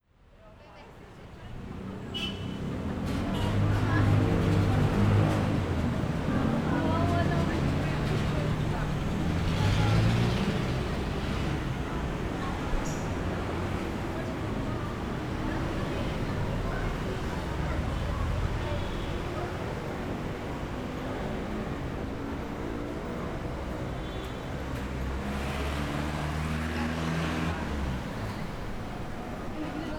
Soundscapes > Urban
Sampaloc, Manila Philippines
Known for its university belt, Sampaloc buzzes with youthful energy, student, jeepneys, and laughter over exams and snacks. Around every corner, cafes, dorms, and review hubs capture the sound of learning and dreams in progress. Specific sounds you can hear: student chatter, school sounds, vehicle sounds, food market, and more.
Street, Sampaloc, Urban, Manila, Jeepneys, Market, People, Field-Recording, Vehicles, Student-life